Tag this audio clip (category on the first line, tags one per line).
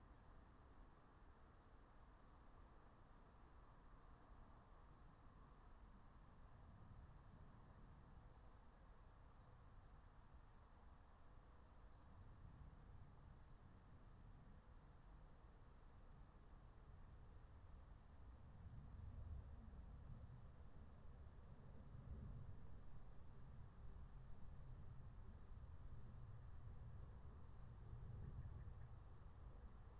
Soundscapes > Nature
natural-soundscape
phenological-recording
nature
alice-holt-forest